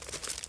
Soundscapes > Nature
gravel scrape
the sound of my foot dragging across a small patch of gravel to emulate the sound of a single footstep on a gravel surface